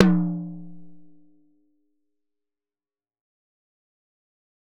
Music > Solo percussion
Hi Tom- Oneshots - 44- 10 inch by 8 inch Sonor Force 3007 Maple Rack
acoustic, beat, beatloop, beats, drum, drumkit, drums, fill, flam, hi-tom, hitom, instrument, kit, oneshot, perc, percs, percussion, rim, rimshot, roll, studio, tom, tomdrum, toms, velocity